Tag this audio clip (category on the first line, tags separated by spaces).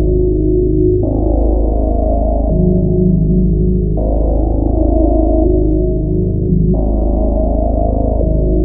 Sound effects > Electronic / Design
loop sci-fi seamless